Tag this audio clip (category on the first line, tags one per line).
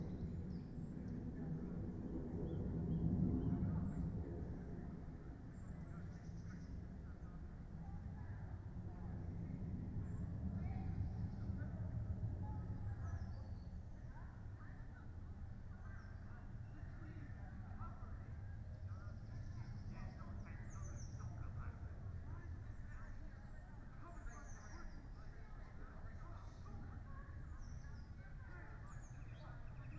Soundscapes > Nature
soundscape
raspberry-pi
weather-data
Dendrophone
artistic-intervention
data-to-sound
natural-soundscape
field-recording
modified-soundscape
phenological-recording
nature
alice-holt-forest
sound-installation